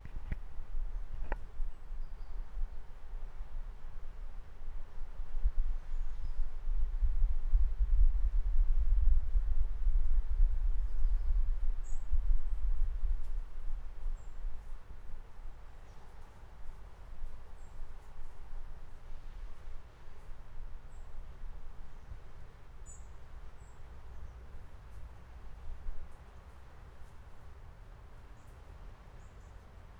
Soundscapes > Nature
Golena San Massimo riverbank loc1
Soudscape on riverbank in Golena San Massimo Recorded with zoom H1
nature-sound, nature-ambience, river